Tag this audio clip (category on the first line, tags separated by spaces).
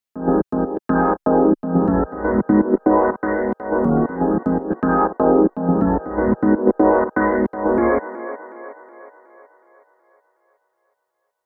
Instrument samples > Synths / Electronic
drive edm Groovy house rhythmic saturation stab